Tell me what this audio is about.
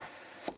Sound effects > Other
Soft chop vegetable 1
Potato being softly chopped using a Santoku knife in a small kitchen.
Soft, Cooking, Chop, Indoor, Kitchen, Vegetable, Chef, Knife, Chief, Cook, Chopping